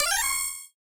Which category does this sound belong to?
Sound effects > Electronic / Design